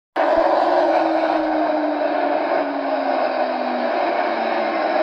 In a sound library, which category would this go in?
Sound effects > Vehicles